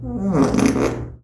Sound effects > Human sounds and actions
FARTReal-Samsung Galaxy Smartphone, CU Stinky Nicholas Judy TDC
A stinky fart. Recorded at The Home Depot.